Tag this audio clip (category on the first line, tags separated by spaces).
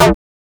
Instrument samples > Percussion
game 8-bit percussion FX